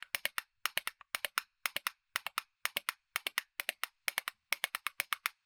Instrument samples > Percussion
MusicalSpoon Large Light Gallop
Recorded On Zoom H5 XY5, AT897 Shotgun Mic, and SM57, and then Summed to Mono (all mics aprox < 3 feet away From source) Denoised and Deverbed With Izotope RX 11
Horse, Wood, Hit, Slap, Spoon, Percussion, Musical, Minimal, Gallop, Strike